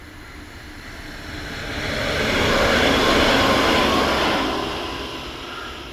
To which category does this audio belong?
Sound effects > Vehicles